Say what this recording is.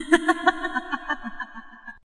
Sound effects > Human sounds and actions
Woman's laugh- reverb 2
A woman giggling, recorded indoor, background noises removed.
laugh, eerie, female, laughter, voice, haha, chuckle, reverb, laughing, woman, giggle